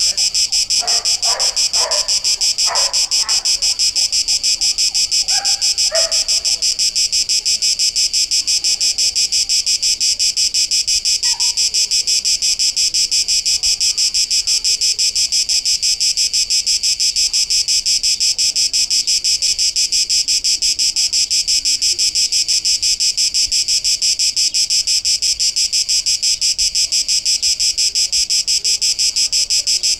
Sound effects > Animals
250710 20h40 Esperaza Gare Trains - Grasshopper up the big tree - MKE600
Sennheiser MKE600 with stock windcover P48, no filter. Weather : Clear sky, little wind. Processing : Trimmed in Audacity.